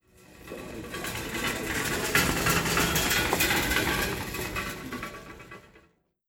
Sound effects > Objects / House appliances
OBJWhled-Samsung Galaxy Smartphone, CU Shopping Cart By Nicholas Judy TDC
A shopping cart passing by. Recorded at Lowe's.
foley, pass-by, Phone-recording, shopping-cart